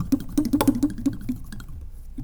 Sound effects > Objects / House appliances
knife and metal beam vibrations clicks dings and sfx-110
Klang, metallic, Vibrate, Wobble, FX, ding, Metal, Vibration, Trippy, Beam, Clang, ting, SFX, Perc, Foley